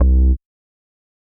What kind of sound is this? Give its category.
Instrument samples > Synths / Electronic